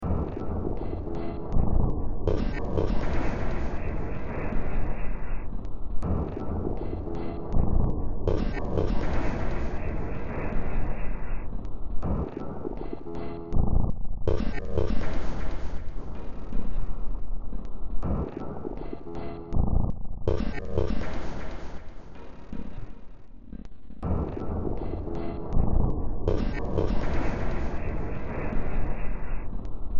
Music > Multiple instruments
Demo Track #3287 (Industraumatic)
Ambient, Cyberpunk, Games, Horror, Industrial, Noise, Sci-fi, Soundtrack, Underground